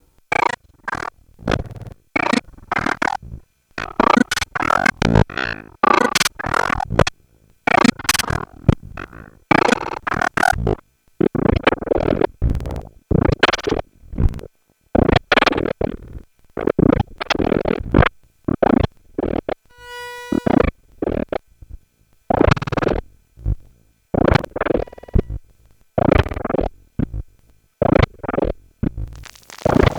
Sound effects > Experimental
This pack focuses on sound samples with synthesis-produced contents that seem to feature "human" voices in the noise. These sounds were arrived at "accidentally" (without any premeditated effort to emulate the human voice). This excerpt was created with looping slices of micro-sound run through the 'Bit Corrupter' program on ALM / Busy Circuits' MFX module; another method which seems to generate voice-like qualities once taken to extremes of processing.